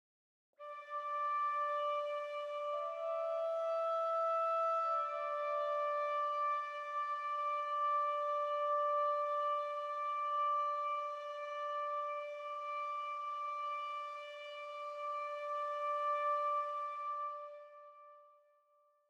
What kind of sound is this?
Instrument samples > Wind
Flute / Bansuri Emotional
the bansuri stem from my track I've Made a Mistake , 113 BPM made using kontakt